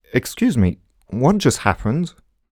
Speech > Solo speech
Surprised - excuse me what just happend
confused, dialogue, FR-AV2, Human, Male, Man, Mid-20s, Neumann, NPC, oneshot, sentence, singletake, Single-take, surprised, talk, Tascam, U67, Video-game, Vocal, voice, Voice-acting, words